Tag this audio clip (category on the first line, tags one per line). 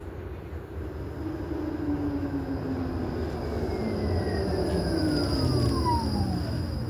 Sound effects > Vehicles
vehicle
tram
transportation